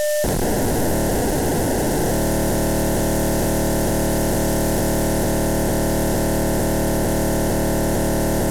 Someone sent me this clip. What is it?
Sound effects > Other
Strange FM Noise (SDR Recording)
Strange FM noise recorded with a Nooelec RTL-SDR USB stick using GQRX software on Linux. Recorded at approximately 466 MHz. Contains only noise.